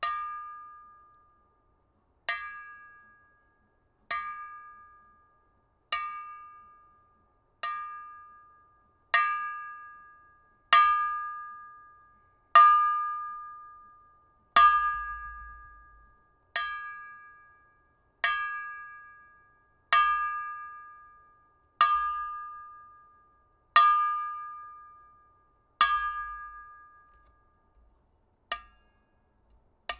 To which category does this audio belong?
Sound effects > Objects / House appliances